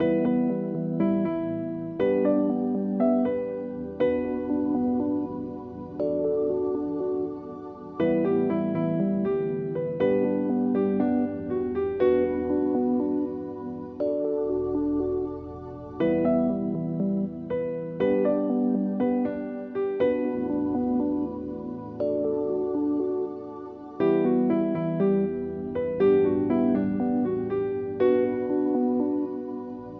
Music > Multiple instruments
Space Exploration and Playing piano in front of the stars
While composing this I imagined a piano floating in the space and playing to the stars. DAW = AUM on iPad Instruments = SynthScaper, BLEASSMonolit, DecentSampler MIDI = Piano Motifs
ipad, Synth, keyboard, piano